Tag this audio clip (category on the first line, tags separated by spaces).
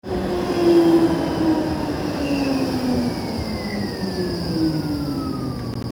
Soundscapes > Urban
transport streetcar